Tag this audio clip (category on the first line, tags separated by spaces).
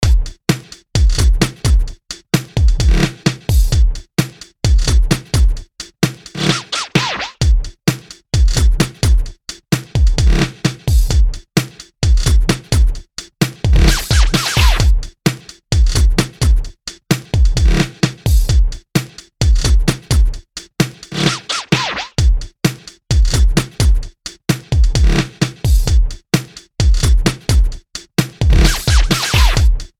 Music > Multiple instruments
130 Bass BPM Clap Dance Drum Drums EDM Electro Free House Kick Loop Music Slap Snare